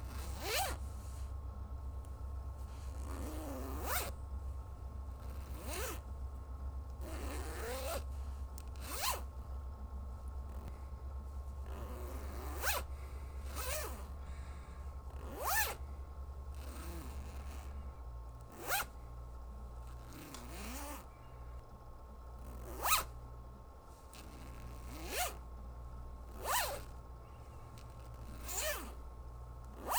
Sound effects > Objects / House appliances
OBJZipr-Blue Snowball Microphone Zipper, Jacket Nicholas Judy TDC
A jacket zipper.
jacket, foley, zipper